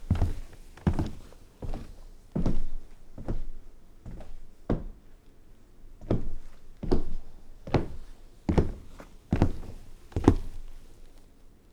Sound effects > Human sounds and actions
Walking away from and towards the mic wearing heavy steel-toes workboots on a wooden floor. Recorded with a Zoom F3 Field Recorder.